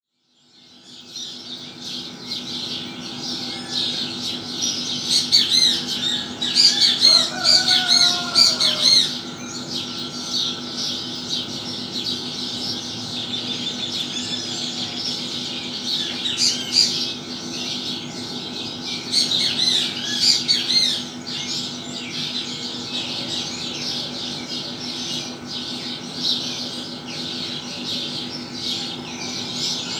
Soundscapes > Urban
Pajaros al amanecer en Asuncion - Paraguay
Ambient sound. Birds singing at sunrise in an undetermined place. A variety of birds can be heard. On the background there is some electrical sound.